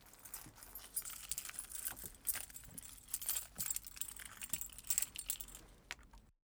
Sound effects > Objects / House appliances
Keys Shuffling / Jingling
Keys being shuffled and jingled around. Probably about 8-9 different attachments on the keyrings including house keys, USBs, Decoration, Vehicle Key-fob, Tesco Clubcard.
jingling, shuffling